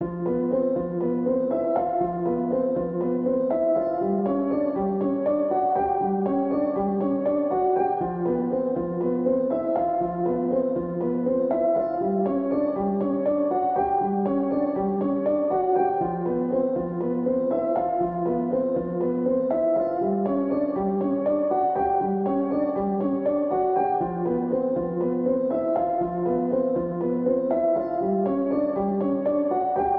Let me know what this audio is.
Music > Solo instrument
Piano loops 110 efect 4 octave long loop 120 bpm
120, 120bpm, free, loop, music, piano, pianomusic, reverb, samples, simple, simplesamples